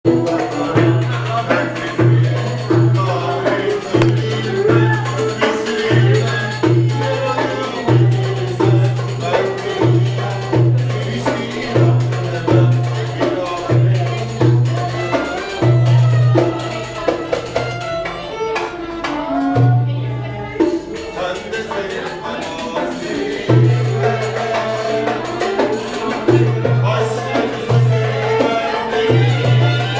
Music > Multiple instruments
Live turkish Music in a restaurant, Istanbul (Cumhuriyet meyanesi) Recorded live with a phone.
turkish, istanbul, music, restaurant, live, Cumhuriyet, meyanesi